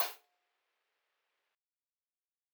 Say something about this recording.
Instrument samples > Percussion
LifeLine Closed Hat
Original sample one shot of a Closed position 14" Mienl Dark Hi Hat!
1-shot, cymbal, drum, drums, hats, hihats, hit, one-shot, percussion, sample